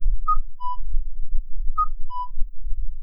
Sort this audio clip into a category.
Sound effects > Other